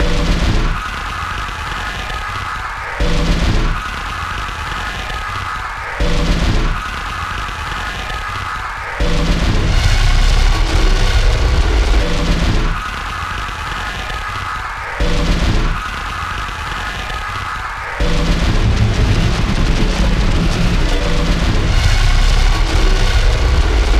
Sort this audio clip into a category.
Music > Other